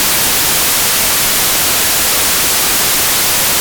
Sound effects > Other
FM Noise (SDR Recording)
FM noise recorded with a Nooelec RTL-SDR USB stick using GQRX software on Linux. Recorded at approximately 466 MHz. Contains only noise.